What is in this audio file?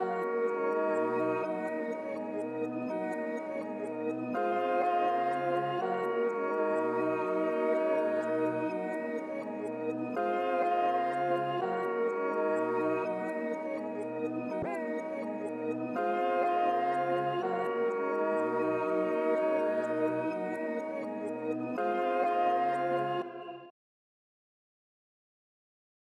Music > Multiple instruments
destined rev 124bpm 1lovewav
1lovewav, analog, atmosphere, dreamy, loop, sample